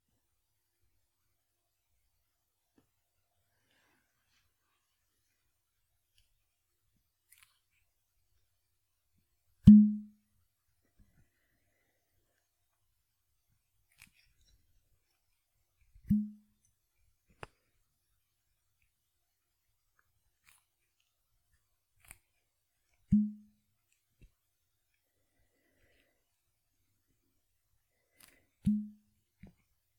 Sound effects > Objects / House appliances
Popping Off A Cork From A Bottle
Removing a cork from a half-full glass bottle of liquor. Handling the bottle. Sloshing the liquor around. Recorded with RØDE NT1 5th Gen.